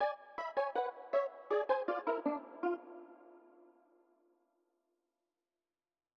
Music > Solo instrument
interlude music
A short sound piece. Maybe useful for a short intermezzo. made with fl studio
loop, music, short